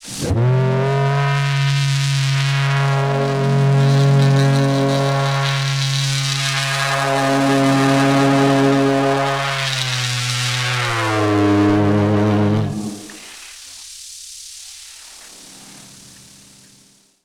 Speech > Processed / Synthetic
wrecked vox 22-001
alien, effect, sound-design, strange